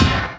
Instrument samples > Percussion
crash bass 1 short
A mix/blend of low-pitched older Zildjian ride and crash files. The result is bad but it sounds good as backing drums for deep layering. Your main drums must be realistic for typical rock and metal music.